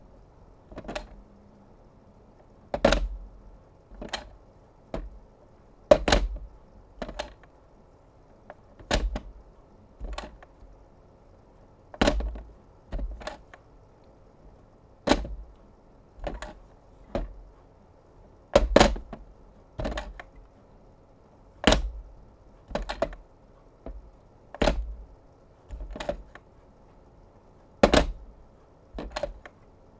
Sound effects > Objects / House appliances
COMTelph-Blue Snowball Microphone, CU Landline Pushbutton, Pick Up, Hang Up, Slam Reciever Nicholas Judy TDC
A landline pushbutton telephone picking up, hanging up and slamming the reciever.